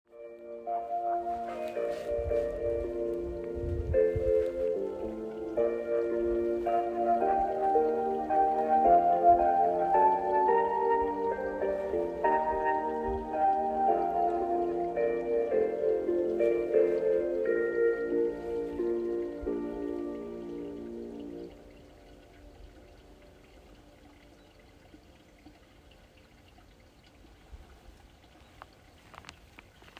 Soundscapes > Nature
Public alarm for midday in Magome, Japan. 17/1/25
publicaddress, alarm, Japanese, Town, midday, middayalarm, alert